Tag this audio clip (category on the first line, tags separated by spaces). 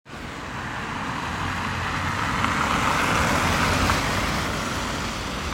Sound effects > Vehicles
automobile outside vehicle car